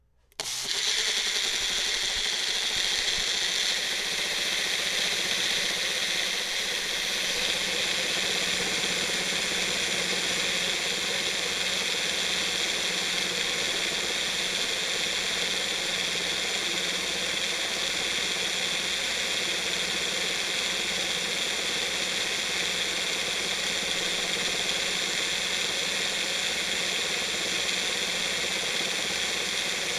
Sound effects > Objects / House appliances
Blender Blending
Sound of a blender mixing, nothing out of the ordinary, made with my blender, recorded with a share sm58
Blending, blender